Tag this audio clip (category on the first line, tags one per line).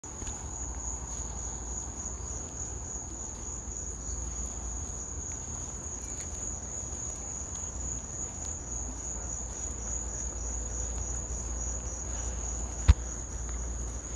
Soundscapes > Nature
ambience
cicada
cricket
field-recording
nature
night
spring
summer